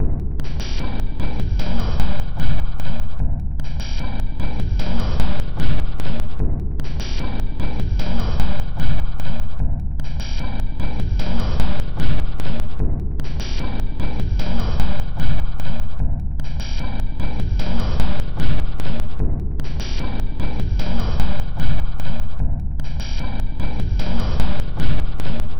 Instrument samples > Percussion
This 75bpm Drum Loop is good for composing Industrial/Electronic/Ambient songs or using as soundtrack to a sci-fi/suspense/horror indie game or short film.
Ambient, Drum, Industrial, Loop, Loopable, Underground, Weird